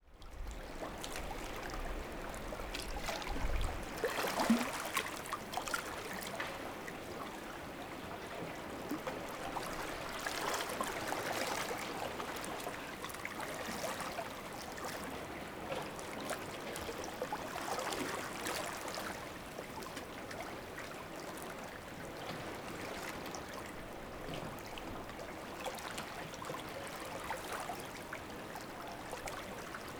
Soundscapes > Nature
Gentle lapping of water in rocky beach
Gentle lapping of water on a rocky beach in Ikaria, Greece, on a summer day.
shore, waves, beach, seaside, ocean, sea, rocky, water